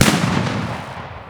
Sound effects > Natural elements and explosions
Loud Firewords Bang Cut Off
Bang with a little sizzle. Likely illegal firework haul by some group at 4AM on Jan 1st 2026 in a Berlin park recorded via Motorola Moto G34 5G. Less windy track extracted, normalized and sliced.
bang, explosion, firework, fireworks, nye, tnt